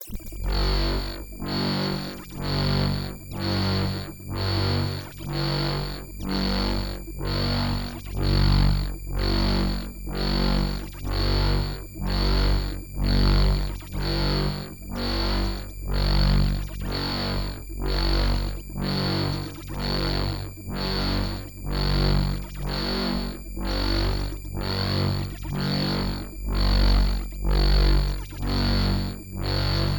Sound effects > Electronic / Design
An alien alarm sound created in Cakewalk using a synth pad.